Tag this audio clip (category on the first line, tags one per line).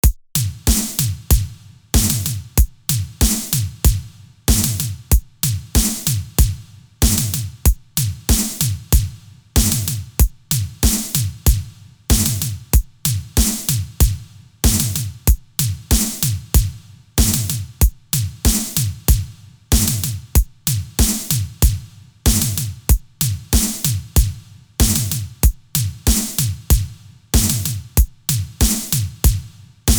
Music > Solo percussion
94
Bass
Bpm
Clap
Dance
Drum
Drums
EDM
Electro
Free
House
Kick
Loop
Music
Slap
Snare